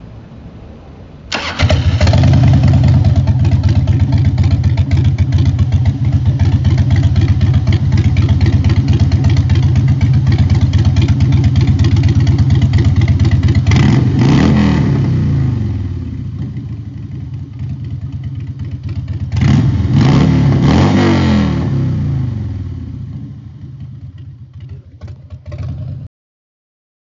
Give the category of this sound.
Sound effects > Vehicles